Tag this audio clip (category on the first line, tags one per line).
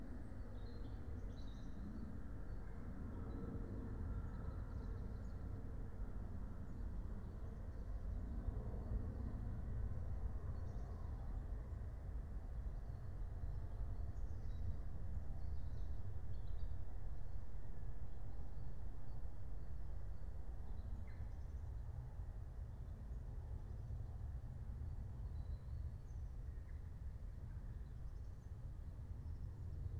Soundscapes > Nature
alice-holt-forest
field-recording
meadow
natural-soundscape
nature
phenological-recording
raspberry-pi
soundscape